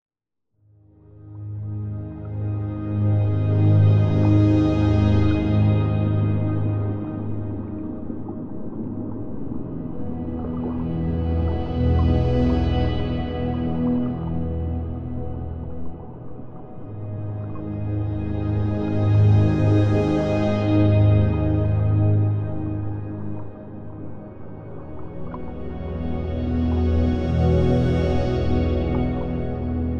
Music > Multiple instruments
Ancient Waters (Title Screen Theme)
aquatic-ambient-music, ocean-music, water-level-theme, water-ambience, water-level-music, ocean-ambience, ocean-biome-music, water-biome-theme, ocean-biome-music-theme, water-biome, ocean-exploration-theme, watery-ambience, aquatic-ambience, peaceful-water-ambience, water-music, ambient-music, soothing-ocean-ambience, watery-ambient-music, ocean-exploration-music, water-level-musical-theme, ocean-music-theme, ocean-biome-ambience